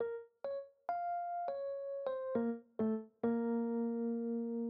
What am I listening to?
Music > Solo instrument
Apple A Day Vintage Keys Harmonies 1 - 102BPM A# Minor
Harmony layer for vintage keys in A# minor at 102 BPM. Made using the Vintage Keys pack for Spitfire LABS in REAPER. First of two parts.
harmony, keyboard, keys, melody, vintage